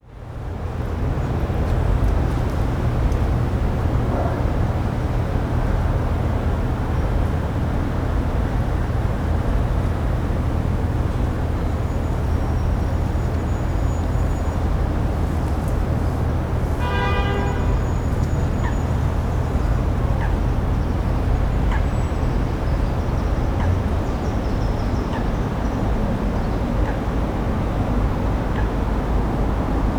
Soundscapes > Urban
A recording of a nature park in Kings Cross, London. Day time.
ambience, city, filed, outdoors, park, recording, urban